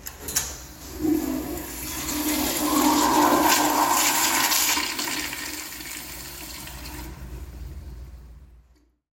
Sound effects > Objects / House appliances
WATRPlmb-Samsung Galaxy Smartphone, CU Pushbutton Sloan Toilet Flush Nicholas Judy TDC
A push-button sloan toilet flush. Recorded at Best Buy.
button, flush, Phone-recording, push, pushbutton, toilet